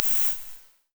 Instrument samples > Synths / Electronic
IR (Analog Device) - Late 90s Soundcraft Signature 12 - GATED
That device is noisy, but these are Soundcraft Signature 12 inbuilt reverbs :) Impulse source was 1smp positive impulse. Posting mainly for archival, but I will definetly use these!!
Analog, Digital, IR, Reverb